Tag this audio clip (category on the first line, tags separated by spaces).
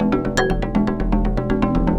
Music > Solo percussion
120bpm Ableton chaos industrial loops soundtrack techno